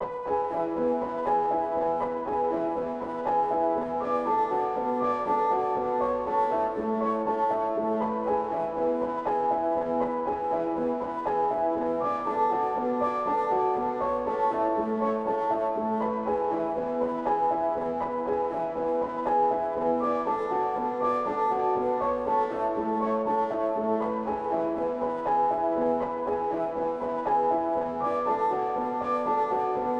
Music > Solo instrument
Piano loops 199 efect 3 octave long loop 120 bpm
music, reverb, samples, simple, simplesamples, 120bpm, loop, piano, 120, pianomusic, free